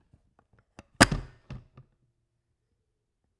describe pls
Sound effects > Objects / House appliances
250726 - Vacuum cleaner - Philips PowerPro 7000 series - Top handle n bucket lock Opening
Subject : A sound from my pack of my brand spanking new Philips PowerPro 7000 series vacuum cleaner. Date YMD : 2025 July 26 Location : Albi 81000 Tarn Occitanie France. Sennheiser MKE600 with P48, no filter. Weather : Processing : Trimmed and normalised in Audacity.
MKE600, Powerpro, Single-mic-mono